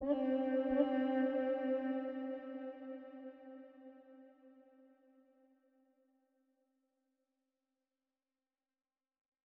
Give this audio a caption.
Sound effects > Other
Sunrise over the walls of Alamut. Made with FL Studio.